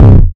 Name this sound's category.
Instrument samples > Percussion